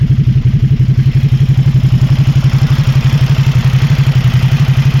Sound effects > Other mechanisms, engines, machines
puhelin clip prätkä (4)
Description (Motorcycle) "Motorcycle Idling: distinctive clicking of desmodromic valves, moving pistons, rhythmic thumping exhaust. High-detail engine textures recorded from close proximity. Captured with a OnePlus Nord 3 in Klaukkala. The motorcycle recorded was a Ducati Supersport 2019."
Ducati, Motorcycle, Supersport